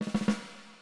Music > Solo percussion
Snare Processed - Oneshot 80 - 14 by 6.5 inch Brass Ludwig
rimshots, snaredrum, hit, realdrum, beat, roll, snareroll, oneshot, sfx, drums